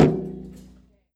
Music > Solo percussion
A drum bang. Recorded at Goodwill.